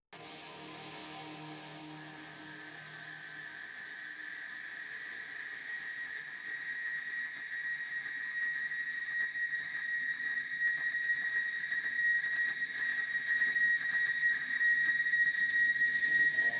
Music > Other
guitar feedback key Bb
Bb, feedback, melodic